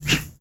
Sound effects > Objects / House appliances
SWSH-Samsung Galaxy Smartphone, CU Racquet, Racquetball Nicholas Judy TDC
A racquetball racquet swish.
foley, Phone-recording, racquet, racquetball, swish